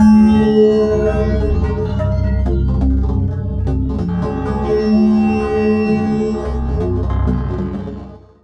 Instrument samples > Synths / Electronic
CVLT BASS 155
sub, subbass, subs, subwoofer, wavetable